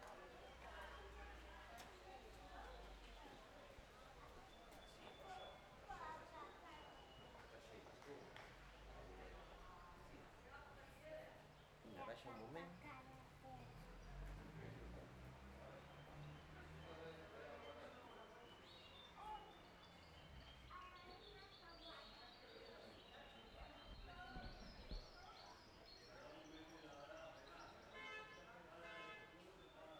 Soundscapes > Urban
AMB Calella, Barcelona, Spain people pass, street, birds LR
Calella, Barcelona, Spain People pass by on the street, birds singing.
Barcelona, birds, Calella, people, Spainpeople